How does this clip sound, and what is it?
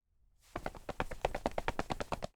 Sound effects > Human sounds and actions
A human running on hardwood floors. I recorded this on a zoom audio recorder.